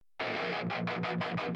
Instrument samples > Other
This is the intro to a metal song that I created on Suno AI
Heavy, intro, Metal